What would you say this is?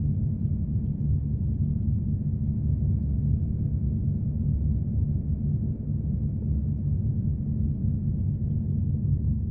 Sound effects > Other
Space Ship (Main Mid Section)
A sound I made using Audacity!